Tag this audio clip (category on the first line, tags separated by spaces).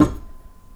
Sound effects > Other mechanisms, engines, machines
sound oneshot fx little rustle bang tools perc strike shop foley pop knock boom percussion thud